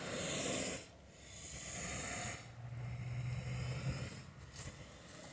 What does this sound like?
Sound effects > Animals
Mules - Mule Breaths, Close Perspective
Recorded with an LG Stylus 2022. A mule, a hybrid crossing sired by a donkey jack to a horse mare, took breaths through its nose.
hybrid, farm, breath, equine, donkey